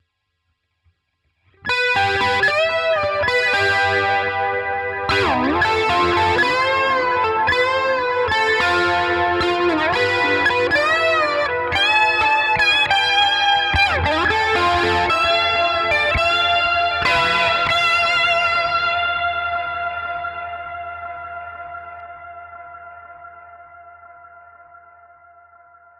Music > Solo instrument
Guitar bending
Mede with a Fender guitar, Amp 5 and some taste.
delay, electric, guitar, reverb